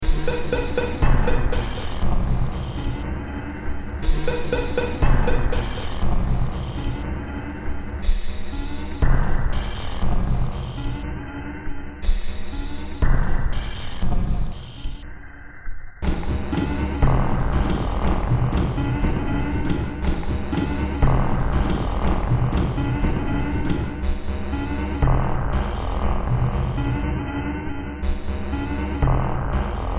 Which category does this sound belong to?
Music > Multiple instruments